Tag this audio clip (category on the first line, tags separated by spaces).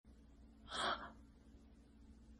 Sound effects > Human sounds and actions
american dramatic female gasp girl videogame vocal voice woman